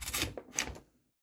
Sound effects > Objects / House appliances
A three hole paper punch punching holes in paper.